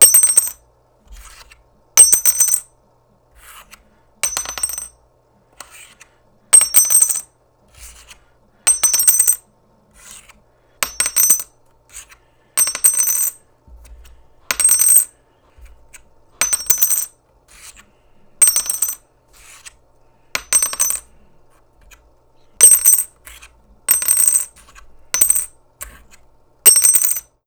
Sound effects > Objects / House appliances
METLImpt-Blue Snowball Microphone, CU Tin Metal Bell, Drop Nicholas Judy TDC
A tin metal bell dropping.
Blue-brand, foley, metal, Blue-Snowball, tin, drop, bell